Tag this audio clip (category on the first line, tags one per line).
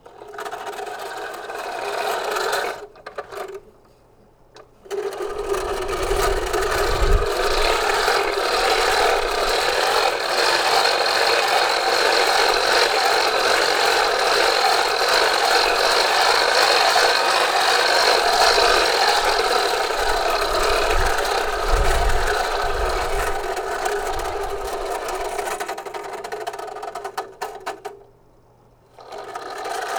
Sound effects > Other mechanisms, engines, machines
machinery
air
dustin-mizer
Blue-brand
blow
machine
cartoon
vehicle
Blue-Snowball